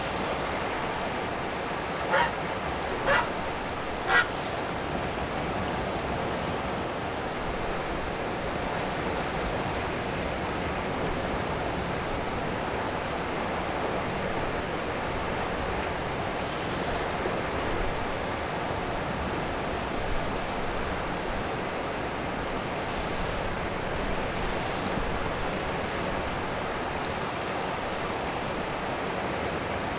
Soundscapes > Nature
WATRSurf-Gulf of Mexico Predawn at West Beach Pass, surf, herons, wind, 415AM QCF Gulf Shores Alabama Zoom F3 with Matched Rode M5
Predawn at West Beach Pass, Gulf Shores, Alabama - breaking surf, Gulf of Mexico, waves, heron, rushing water, 4:15AM.